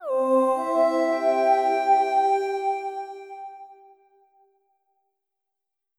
Music > Solo instrument
Angelic Fanfare 1
Simple celebratory melody made with FL Studio / Kontakt / Vocalise.
ambiance, angel, angelic, blissful, celebration, celebratory, cheer, cheerful, choir, cinematic, divine, ethereal, fanfare, fantasy, good, harmony, heavenly, holy, majestic, melody, opener, orchestral, radiant, reveille, sound, sound-effect, triumphant, uplifting, victory, vocal